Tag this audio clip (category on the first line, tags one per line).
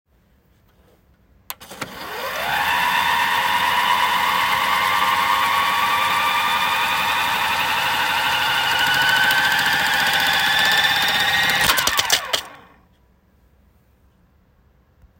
Sound effects > Other mechanisms, engines, machines
collection
enlarge
microfilm
newspapers
archive
film
microscopic
documents
reader
screen
35mm
research
scanner
books
optical
reading
images
light
records
plastic
library